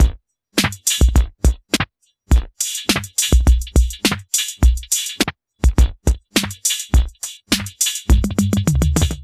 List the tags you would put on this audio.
Music > Solo percussion
606 Analog Bass Drum DrumMachine Loop Mod Modified Synth Vintage

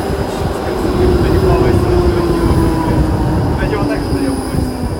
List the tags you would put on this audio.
Sound effects > Vehicles
city field-recording Tampere traffic tram

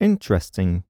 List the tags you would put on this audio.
Speech > Solo speech
Shotgun-mic Voice-acting VA Hypercardioid MKE-600 Calm Single-mic-mono mid-20s july 2025 Sennheiser MKE600 Male Tascam interesting Shotgun-microphone FR-AV2 Adult Generic-lines

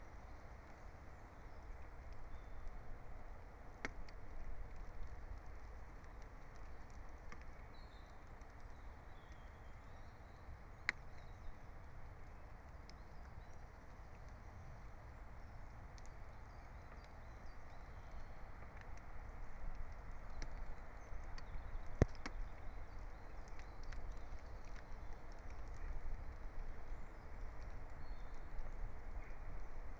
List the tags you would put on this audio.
Soundscapes > Nature
alice-holt-forest field-recording meadow natural-soundscape phenological-recording

